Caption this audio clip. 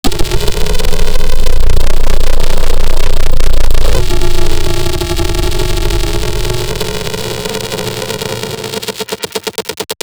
Sound effects > Electronic / Design
Optical Theremin 6 Osc ball infiltrated-003
Alien, Infiltrator, Experimental, Synth, Robotic, Analog, noisey, Handmadeelectronic, Theremin, Electronic, Bass, Dub, Noise, Optical, Digital, FX, Trippy, DIY, Sweep, Scifi, Robot, Glitch, Spacey, SFX, Sci-fi, Glitchy, Electro, Theremins, Otherworldly, Instrument